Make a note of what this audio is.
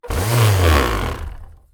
Sound effects > Other mechanisms, engines, machines
makita orbital sander foley-006
Foley, fx, Household, Mechanical, Metallic, Motor, sANDER, sANDING, Scrape, sfx, Shop, Tool, Tools, Woodshop, Workshop